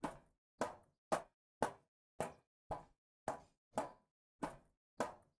Sound effects > Objects / House appliances
Footsteps On Metal
Variations of footsteps over a metal structure. Intended to be used in a game so each instance is already edited as a single step. Gear: Zoom H4n Sennheiser MKH 50